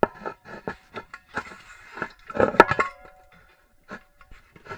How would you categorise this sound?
Sound effects > Experimental